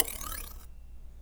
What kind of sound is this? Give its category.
Sound effects > Objects / House appliances